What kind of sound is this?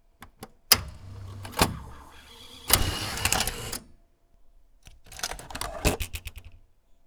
Sound effects > Other mechanisms, engines, machines
Sound of a Sony VO-5630 U-matic tape recorder ejecting a cassette. At the very start of the sound you can hear the eject button pressed followed by the machine ejecting the cassette and at the very end the cassette is pulled out of the compartment. Recorded with a Zoom H1n.